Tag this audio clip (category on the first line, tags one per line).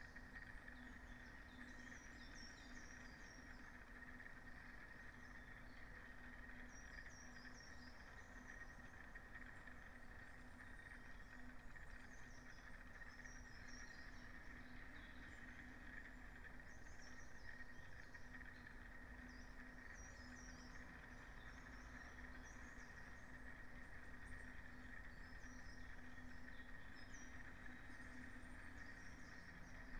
Soundscapes > Nature
Dendrophone
raspberry-pi
alice-holt-forest
sound-installation
phenological-recording
artistic-intervention
natural-soundscape
weather-data
field-recording
nature
data-to-sound
soundscape
modified-soundscape